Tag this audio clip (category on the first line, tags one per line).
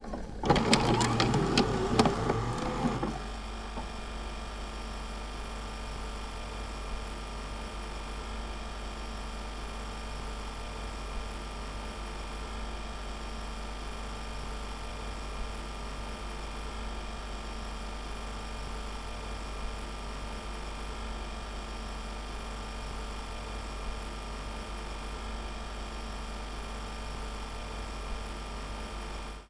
Sound effects > Electronic / Design
Play
tape
VCR